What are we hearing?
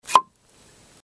Sound effects > Objects / House appliances
Open a wine
Opening the cork of a wine bottle. This sound was recorded by me using a Zoom H1 portable voice recorder.
bottle, cork, drink, glass, liquid, opening, wine, wine-glass